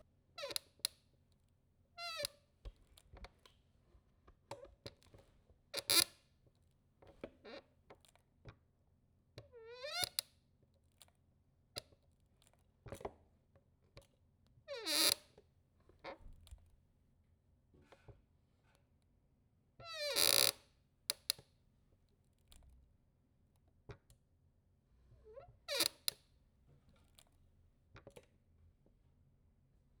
Sound effects > Objects / House appliances
Squeaky chair 1 - Dji-mic3
Subject : My squeaky office chair before applying some WD-40 (it fixed it a charm). Date YMD : 2025 October 21 Location : Indoor. Hardware : Dji-Mic3 internal recording. Weather : Processing : Trimmed and normalised in Audacity.
chair, Dji, grincing, metal, mic3, office-chair, Omni, squeak, squeaky, squeeky